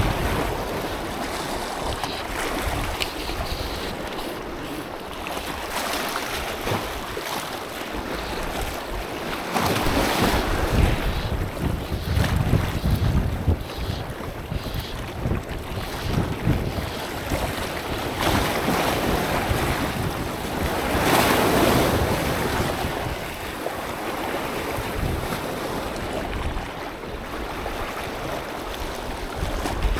Soundscapes > Nature
Ocean Waves Crashing Again Rocks
This recording is waves crashing against rocks. There is a little wind noise but can be clipped to remove
coast, ocean, ocean-noise, seaside, surf, waves, waves-breaking, waves-crashing-against-rocks